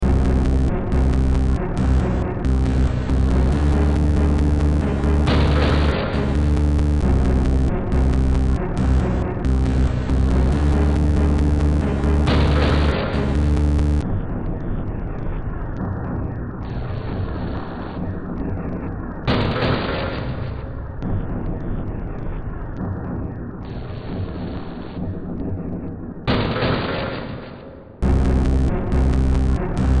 Music > Multiple instruments
Demo Track #3221 (Industraumatic)
Ambient, Cyberpunk, Games, Horror, Industrial, Noise, Sci-fi, Soundtrack, Underground